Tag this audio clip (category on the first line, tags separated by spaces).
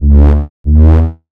Instrument samples > Synths / Electronic
Analog Asym Bass snare Synthwave